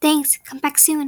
Speech > Solo speech
Female Shopkeeper Buying
A female shopkeeper says something. Recorded and edited in Turbowarp Sound Editor
speak, voice, female